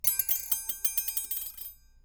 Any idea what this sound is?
Sound effects > Objects / House appliances
Metal Tink Oneshots Knife Utensil 6
Beam, Clang, ding, Foley, FX, Klang, Metal, metallic, Perc, SFX, ting, Trippy, Vibrate, Vibration, Wobble